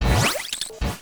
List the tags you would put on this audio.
Sound effects > Electronic / Design
digital
Glitch
hard
one-shot
pitched
stutter

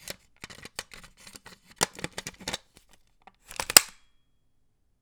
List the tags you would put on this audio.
Sound effects > Objects / House appliances
Airsoft
handling-noise
FR-AV2
Insertion
NT5
Rode
Mono
MP5
Tascam
mag
Air-soft

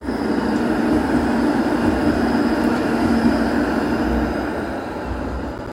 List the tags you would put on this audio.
Sound effects > Vehicles
sunny
tram

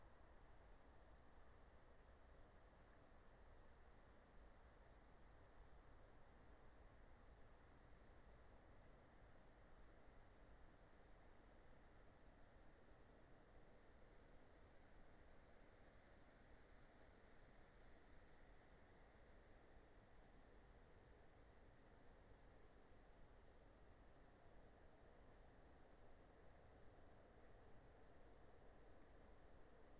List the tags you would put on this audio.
Soundscapes > Nature
raspberry-pi
natural-soundscape
alice-holt-forest
soundscape
field-recording
phenological-recording
meadow
nature

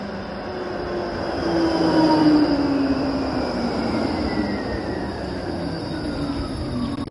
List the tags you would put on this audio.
Sound effects > Vehicles
field-recording
Tampere

